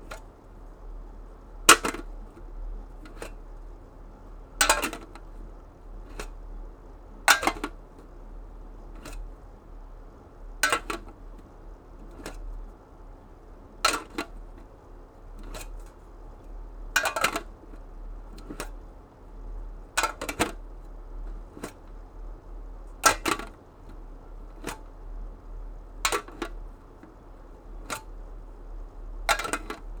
Sound effects > Objects / House appliances
A tin valentine's day chocolate box open and close.